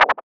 Instrument samples > Synths / Electronic
An abstract, swirly sound effect made in Surge XT, using FM synthesis.